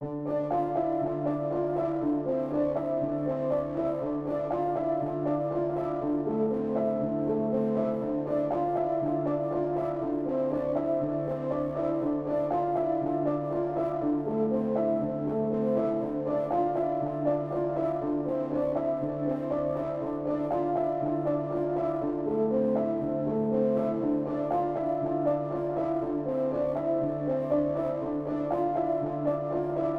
Music > Solo instrument
Piano loops 183 efect 3 octave long loop 120 bpm
free, samples, reverb, simple, music, 120, pianomusic